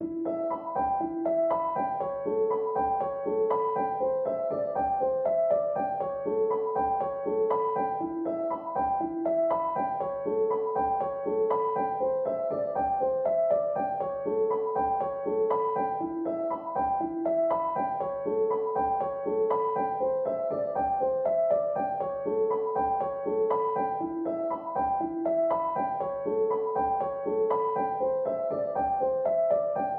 Music > Solo instrument
Piano loops 190 octave up long loop 120 bpm
120, 120bpm, free, loop, music, piano, pianomusic, reverb, samples, simple, simplesamples